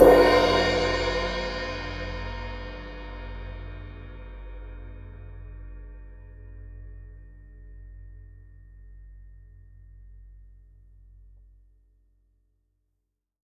Music > Solo instrument
Zildjian 16 inch Crash-013
16inch, Crash, Custom, Cymbal, Cymbals, Drum, Drums, Kit, Metal, Oneshot, Perc, Percussion, Zildjian